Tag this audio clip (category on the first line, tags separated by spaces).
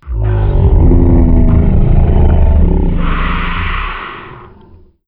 Sound effects > Electronic / Design
cathulu catulu Chaosium creature cthulhu cthulu ctulu demon devil dragon elder evil great-old-one great-one monster mythological mythos octopus spirit the-great-one